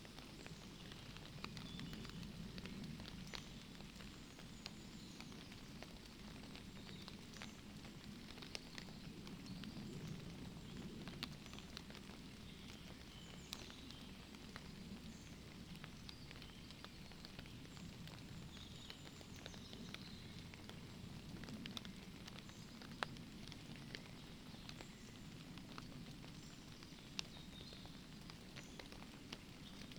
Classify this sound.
Soundscapes > Nature